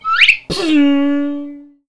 Sound effects > Electronic / Design
A zip up and take off.